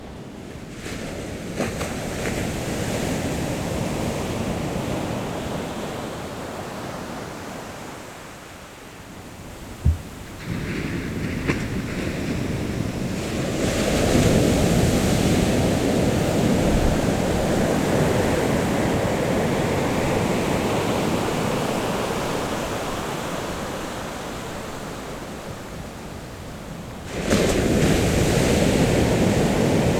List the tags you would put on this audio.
Sound effects > Natural elements and explosions
mare
sea
beach
surf
Niteroi
waves
field-recording
praia
lap
brazil
water
brasil

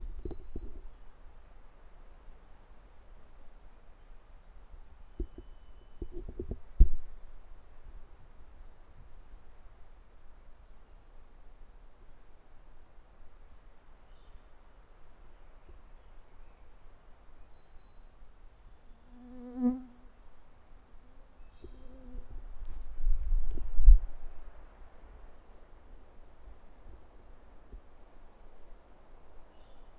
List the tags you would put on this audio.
Soundscapes > Nature
Morning
Mosquito
Bird

